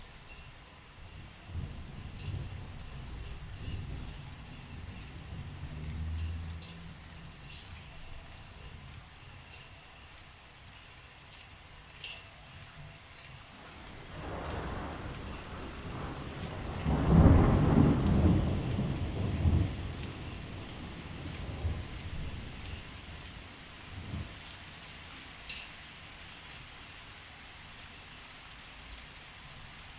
Soundscapes > Nature
Thunder & rain
Recording of a natural thunderstorm featuring distant rumbles of thunder, occasional lightning cracks, and steady rainfall. Self recorded with DJI osmo pocket 2 camera.
rainstorm, thunderstorm, lightning, weather, storm, thunder, rain, field-recording